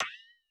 Sound effects > Experimental
metal high pitched boink
Tip of a saber being bend and then released, creating this boing sound effect Saber is a Kung-Fu one with thin and wobbly metal blade for training purposes ____________________________________________________________________________________ Recording: Microphone: Piezo Contact-Microphone (very cheap quality) Device: ZOOM F3 ___________________________________________________________
metal, springy